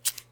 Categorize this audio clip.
Sound effects > Other